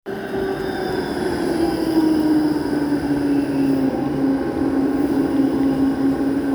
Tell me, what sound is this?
Soundscapes > Urban
voice 17-11-2025 1 tram
What: Tram passing by sound Where: in Hervanta, Tampere on a cloudy day Recording device: samsung s24 ultra Purpose: School project
Tram, Rattikka